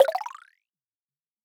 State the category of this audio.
Sound effects > Electronic / Design